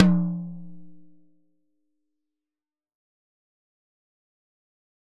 Music > Solo percussion
Hi Tom- Oneshots - 52- 10 inch by 8 inch Sonor Force 3007 Maple Rack
percs, fill, velocity, acoustic, rimshot, beatloop, beats, kit, rim, hitom, flam, beat, toms, instrument, drumkit, percussion, drums, perc, roll, drum, hi-tom, studio, oneshot, tom, tomdrum